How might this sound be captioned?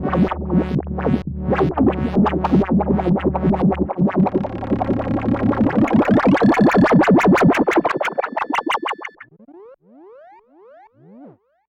Sound effects > Experimental
Analog Bass, Sweeps, and FX-051
from a collection of analog synth samples recorded in Reaper using multiple vintage Analog synths alongside analog delay, further processing via Reaper
electro, mechanical, bassy, trippy, scifi, dark, electronic, effect, sweep, sample, robot, fx, synth, vintage, basses, korg, bass, robotic, sci-fi, snythesizer, sfx, pad, complex, weird, oneshot, analogue, alien, analog, machine, retro